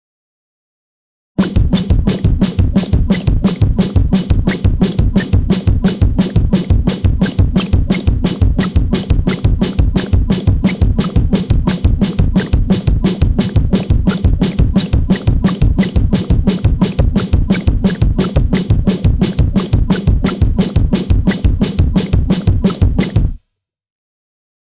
Music > Solo percussion
Simple Bass Drum and Snare Pattern with Weirdness Added 032
Bass-Drum, Experimental, Experiments-on-Drum-Beats, Experiments-on-Drum-Patterns, Fun, FX-Drum, FX-Laden-Simple-Drum-Pattern, Interesting-Results, Noisy, Simple-Drum-Pattern, Snare-Drum